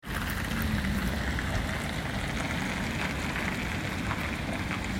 Sound effects > Vehicles
Car driving in Tampere. Recorded with iphone in fall, humid weather.
field-recording, auto, car, city, traffic, street